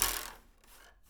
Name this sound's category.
Sound effects > Other mechanisms, engines, machines